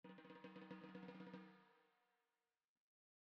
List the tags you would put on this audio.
Music > Solo percussion
acoustic
beat
brass
crack
drum
drumkit
drums
flam
fx
hit
hits
kit
ludwig
oneshot
perc
percussion
processed
realdrum
realdrums
reverb
rim
rimshot
rimshots
roll
sfx
snare
snaredrum
snareroll
snares

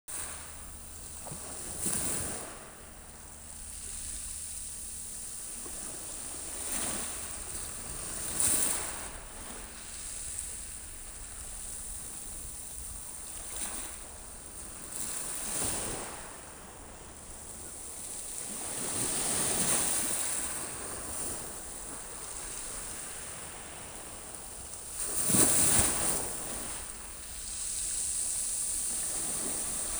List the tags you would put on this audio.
Soundscapes > Nature
pebbles
ocean
shore
coastal
coast
water
seaside
sand
waves
sea
surf
lapping
beach

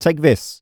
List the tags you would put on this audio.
Speech > Solo speech
Adult
Generic-lines
Calm
Shotgun-mic
Male
mid-20s
Sennheiser
MKE-600
VA
july
Hypercardioid
Voice-acting
FR-AV2
take-this
Shotgun-microphone
Single-mic-mono
2025
MKE600
Tascam

